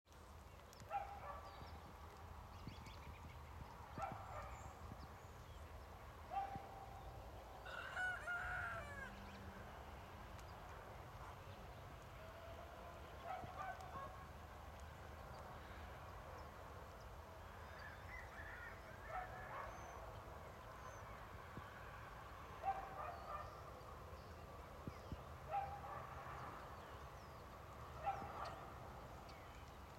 Soundscapes > Nature
New rooster afternoon first call 02/08/2024
New rooster afternoon first call